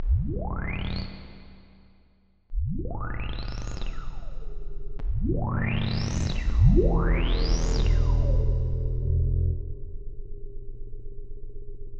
Soundscapes > Synthetic / Artificial
PPG Wave 2.2 Boiling and Whistling Sci-Fi Pads 2
scifi, PPG-Wave, noise-ambient, dark-soundscapes, noise, vst, content-creator, cinematic, mystery, dark-design, drowning, science-fiction, horror, sci-fi, dark-techno, sound-design